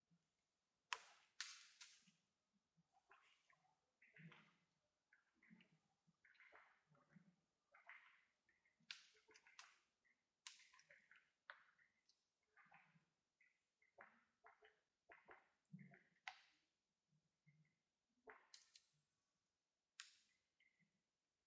Sound effects > Animals
Woodpecker pecking a tree, and a falling bark. Location: Poland Time: November 2025 Recorder: Zoom H6 - SGH-6 Shotgun Mic Capsule
bark, bird, field-recording, forest, impact, pecking, woodpecker